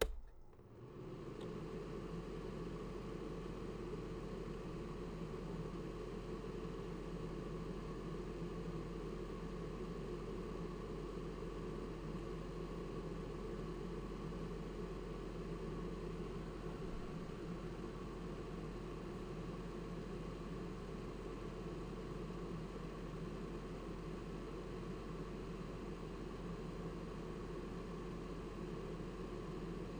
Sound effects > Objects / House appliances
MACHAppl-MCU Heater, Turn On, Run, Off Nicholas Judy TDC
A heater turning on, running and turning off.
run, turn-on, turn-off, heater